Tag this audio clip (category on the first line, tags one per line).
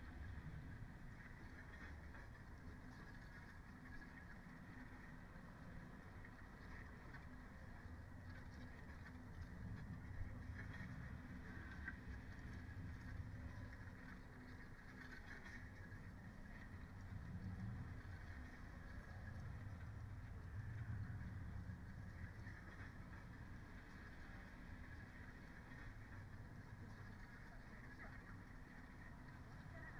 Soundscapes > Nature
artistic-intervention
raspberry-pi
phenological-recording
weather-data
nature
field-recording
sound-installation
natural-soundscape
Dendrophone
alice-holt-forest
modified-soundscape
data-to-sound
soundscape